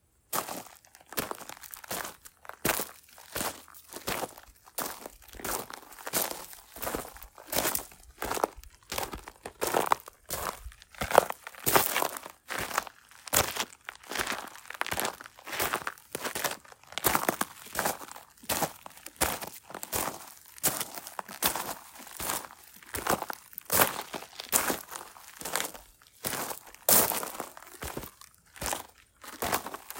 Sound effects > Human sounds and actions
Footsteps Gravel Stones - Walk
I walk on the pebbles in the mountains. There is only one rhythm: walking. * No background noise. * No reverb nor echo. * Clean sound, close range. Recorded with Iphone or Thomann micro t.bone SC 420.
beach, camping, climbing, crunch, feet, foot, footstep, footsteps, gravel, gravels, hike, hiking, lake, mountain, mountaineering, mountains, outdoors, pebbles, sand, step, steps, stone, tent, trail, walk, walking